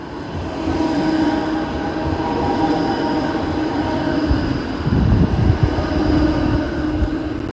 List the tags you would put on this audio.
Sound effects > Vehicles
field-recording Tampere tram

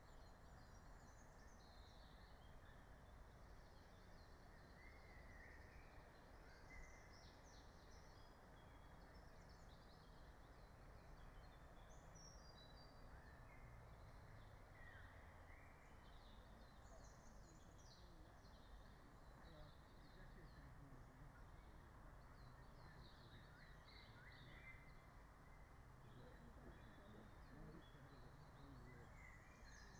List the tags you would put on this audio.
Soundscapes > Nature
alice-holt-forest field-recording natural-soundscape nature phenological-recording soundscape

